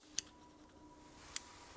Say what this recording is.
Sound effects > Objects / House appliances
Scissor Foley Snips and Cuts 6
cut, foley, fx, household, metal, perc, scissor, scissors, scrape, sfx, slice, snip, tools